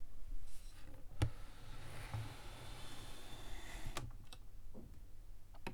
Sound effects > Objects / House appliances
Wooden Drawer 02
drawer, dresser, open